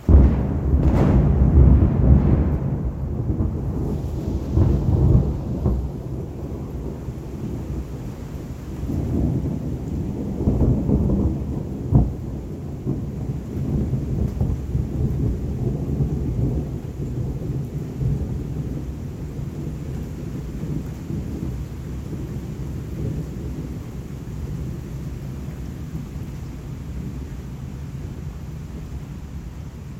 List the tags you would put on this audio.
Sound effects > Natural elements and explosions
lightning
big
rumble
Phone-recording
strike

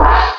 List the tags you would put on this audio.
Instrument samples > Percussion
bell
Bosporus
brass
bronze
chime
China
copper
crash
cymbal
cymballs
disc
disk
fake
gong
Istanbul
Meinl
metal
metallic
Paiste
percussion
Sabian
steel
tam
tam-tam
tamtam
Zildjian
Zultan

